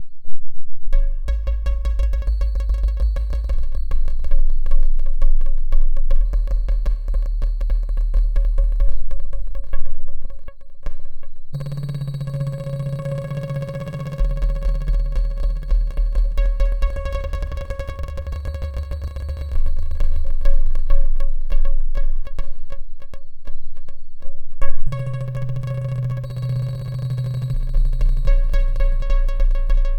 Music > Solo percussion
Elastic Evolving Bouncing Texture out of a Kick
This is an elastic evolving bouncing texture. It was a creator by using a kick sample from the factory library of Digitakt 2.
bounce, bouncing, evolving, experimental, kick, texture